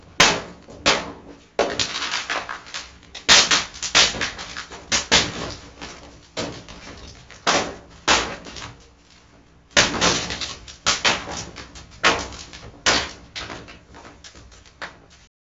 Sound effects > Objects / House appliances
the unrelated prompt was: a drum kick with tomato splatter and multiple friction sounds of sword over rough and rusty sheet metal with tomato splattering The phase shift was caused by doubling/cloning a puny segment at the beginning of only one channel. I don't like this sample at all but I noticed others like samples I consider useless for me. It is usable for other thus I uploaded it for the samplephile community, not for me. • Audacity → View → Toolbars → Device Toolbar • select the Audio Host (Windows WASAPI) • choose the correct recording device (Loopback Option) For example, you might see: "Speakers (Realtek High Definition Audio) (loopback)" The sounds are carefully selected and remixed on WaveLab 11.